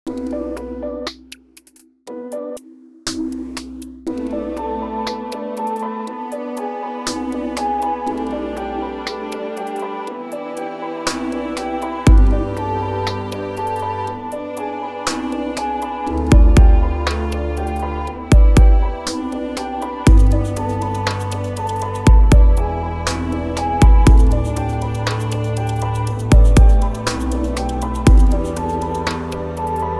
Music > Multiple instruments
Strings created with Kontakt String Session Pro2, percs made with Microtonic, Kick 3, and snare samples from my Procsessed Snares pack. thanks to josefpres for the piano loops, keep em comin~
bass, keyloop, pianoloop